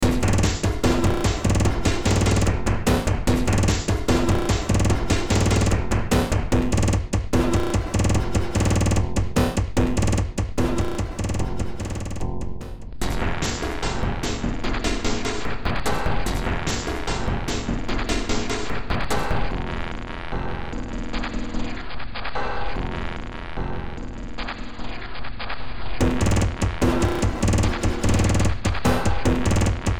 Music > Multiple instruments
Short Track #3113 (Industraumatic)
Noise, Horror, Games, Sci-fi, Underground, Ambient, Cyberpunk, Soundtrack, Industrial